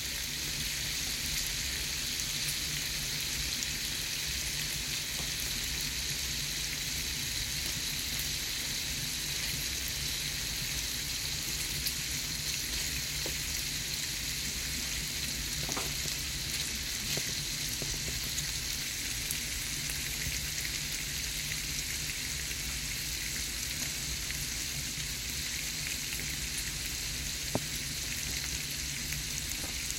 Sound effects > Objects / House appliances
FOODCook-Samsung Galaxy Smartphone Bacon Frying, Looped Nicholas Judy TDC
Bacon frying. Looped.
bacon, frying, loop, Phone-recording